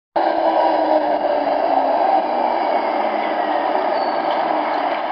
Sound effects > Vehicles
tram stopping3
Sound of a a tram slowing down at tram stop in Hervanta in December. Captured with the built-in microphone of the OnePlus Nord 4.
tram, track, traffic, field-recording